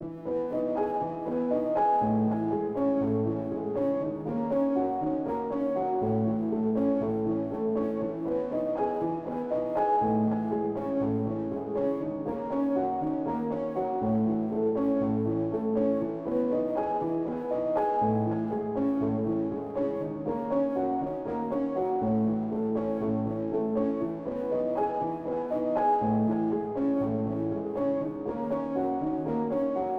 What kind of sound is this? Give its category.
Music > Solo instrument